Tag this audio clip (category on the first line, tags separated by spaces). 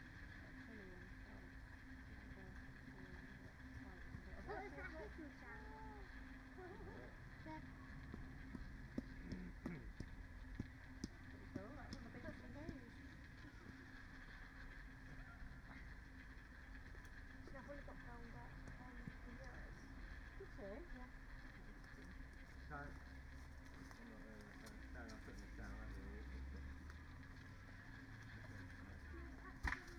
Soundscapes > Nature
soundscape phenological-recording data-to-sound weather-data sound-installation modified-soundscape nature field-recording artistic-intervention natural-soundscape alice-holt-forest raspberry-pi Dendrophone